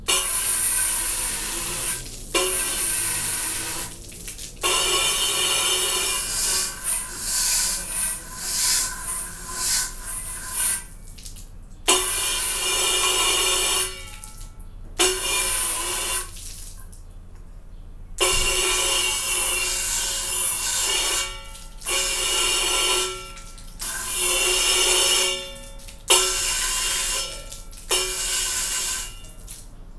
Soundscapes > Urban
Garden Hose in Jet Mode - Cleaning Metal Pet Bowl
Garden Hose in Jet Mode. I am cleaning a quite dirty metallic pet bowl in my suburban yard. A bit of suburban neighbouhood ambience is heard on the background. Recorded with Tascam DR-05X portable mini recorder.
ambience, Garden-Hose, water-fx, water-effect, metal-fx, metal-effect, Water, Metal, Hose, household, cleaning